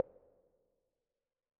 Sound effects > Electronic / Design
UI Back
Made with the Vital synth in FL Studio — [SFX: back] Designed for casual games.
back, casual, videogames